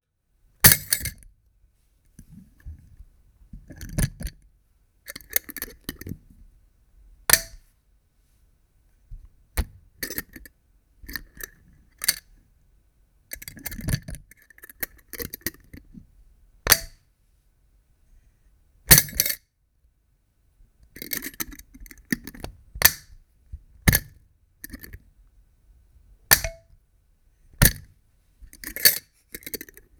Sound effects > Objects / House appliances
CONTGlass Cinematis OpeningClosingContainers BottleGlassSwingTop MidSize WithContent PopOpenClose 02 Freebie
Mid-size glass swing-top bottle with liquid content, popping open and closing. This is one of several freebie sounds from my Random Foley | Vol. 4 | Pots & Containers pack.
Bottle, Close, Effects, Foley, Freebie, Glass, Handling, Open, Pop, PostProduction, Recording, SFX, Sound, Zoom